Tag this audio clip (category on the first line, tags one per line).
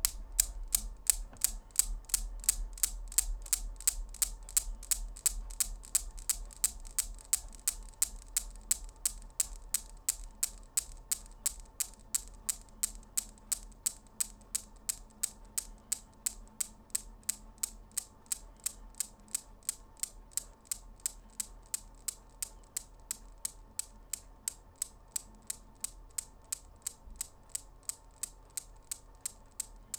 Sound effects > Objects / House appliances
away
Blue-brand
Blue-Snowball
clack
clock
continuous
fade
foley
newtons-cradle
tick
toy